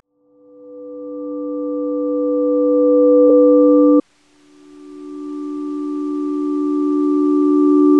Instrument samples > Other
Xylovibez Reverse LoFi
Lo-fi reversed vibraphone texture with emotional warmth and dust.
emotional, ambient, texture, reversed, dust, Lo-fi, vibraphone, warmth